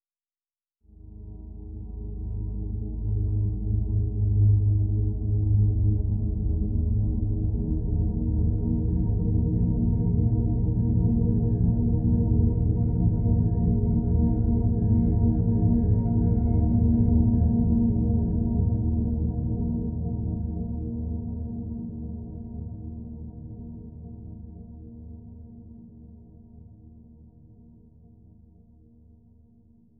Soundscapes > Synthetic / Artificial
Eerie drone for background ambience. Created using Ableton 11 and Omnisphere by Spectrasonics.
Ableton, Ambient, Drone